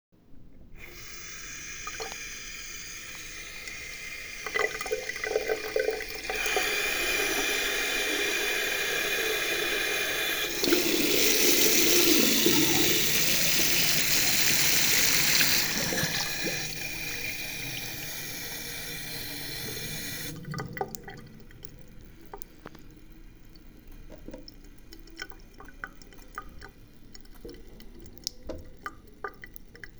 Sound effects > Objects / House appliances
20250516 1603 tap phone microphone
recording, field, atmophere